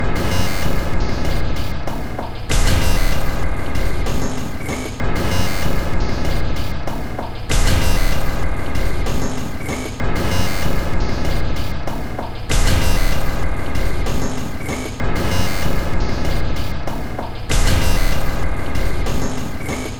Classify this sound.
Instrument samples > Percussion